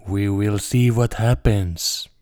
Speech > Solo speech
we will see what happens
Recorded with Rode NT1-A